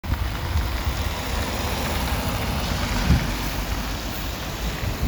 Soundscapes > Urban
A bus passing the recorder in a roundabout. The sound of the bus tires and the sound of the bus engine can be heard. Recorded on a Samsung Galaxy A54 5G. The recording was made during a windy and rainy afternoon in Tampere.
engine, bus, passing